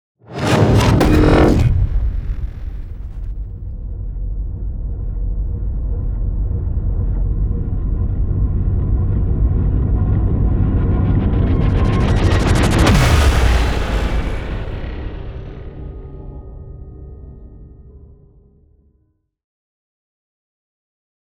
Sound effects > Other
Sound Design Elements SFX PS 073
tension, explosion, trailer, effect, transition, epic, game, metal, sweep, stinger, movement, impact, video, implosion, whoosh, riser, indent, cinematic, deep, reveal, industrial, sub, bass, boom, hit